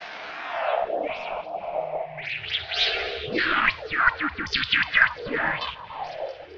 Soundscapes > Synthetic / Artificial
LFO Birdsong 36
Birdsong, LFO, massive